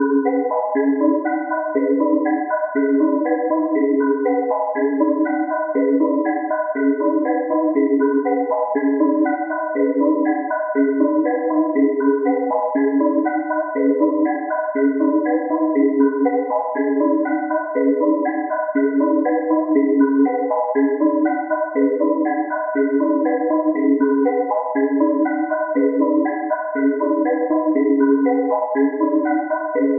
Music > Other
cartoon character dance - 120 bpm
A BeepBox experiment: an echoing rhythmic yet erratic looped synth note sequence gives the impression of an odd cartoon character or game character dancing or moving about rapidly. 120 bpm, 4/4, B major
games, synth, cartoon, electronic, funny, rhythmic, 120bpm, loop, gaming, game, music